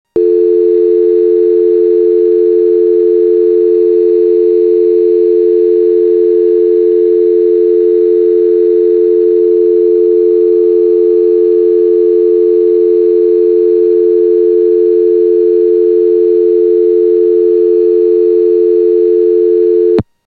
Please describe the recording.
Sound effects > Objects / House appliances
Dial Tone
Dialtone on a telephone
dialtone, electronic, phone, ringing, telephone